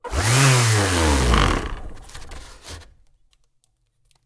Sound effects > Other mechanisms, engines, machines
makita orbital sander foley-005
Foley, fx, Household, Mechanical, Metallic, Motor, sANDER, sANDING, Scrape, sfx, Tool, Tools, Woodshop, Workshop